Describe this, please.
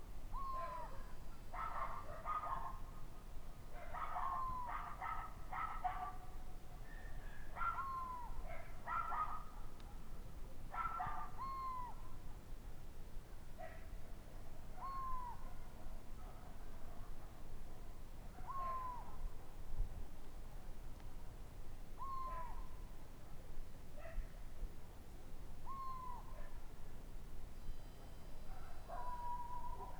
Soundscapes > Nature
Ambience Night StrangeWithDogsBirdWhistle Part2
Record - Zoom h1n. I was interested in bird sound at night, and decided to recorded it part 1
field-recording, eerie, soundscape, nature, strange, night, dogs, background, outdoor, ambient, whistle, mysterious, bird